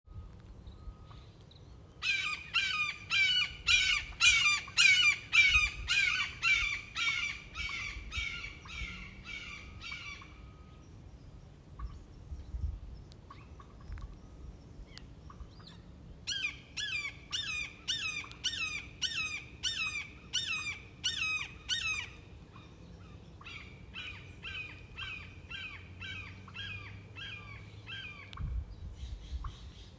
Soundscapes > Nature
Red shoulder hawks, turkeys, breezes 08/13/2022
Red shoulder hawks, turkeys, breezes
farmland, breeze, turkey, field-recording